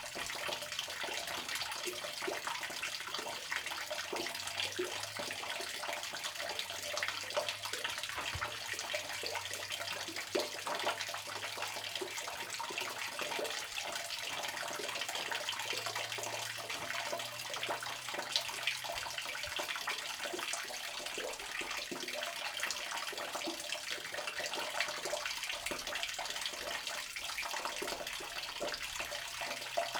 Sound effects > Natural elements and explosions
Stream of water from a fountain located in Piemonte, Italy. Recorded using a Zoom H4N.
water, splash